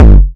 Instrument samples > Percussion
BrazilFunk Kick 17
Kick, Distorted, BrazilianFunk, BrazilFunk